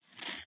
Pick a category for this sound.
Sound effects > Objects / House appliances